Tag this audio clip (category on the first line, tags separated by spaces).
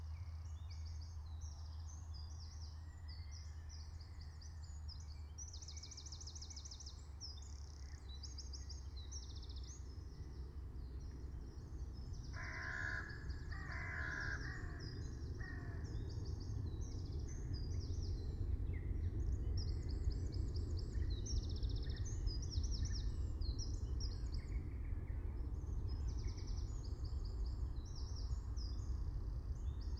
Soundscapes > Nature
field-recording nature meadow raspberry-pi soundscape alice-holt-forest natural-soundscape phenological-recording